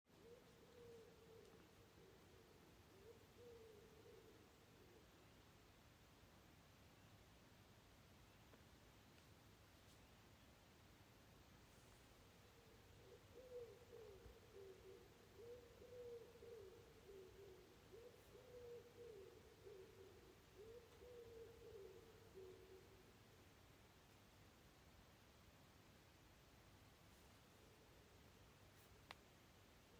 Soundscapes > Nature
Two forest birds intercommunicate from a distance close to a motorway on a hot humid summer afternoon just outside Dalstorp Sweden. Original field-recording.